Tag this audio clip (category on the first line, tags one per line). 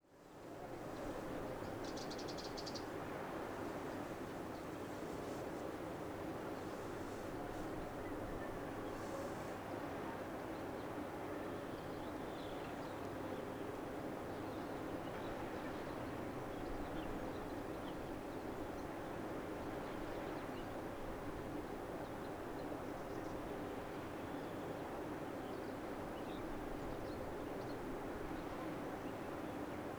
Soundscapes > Nature
ocean
bay
beach
sea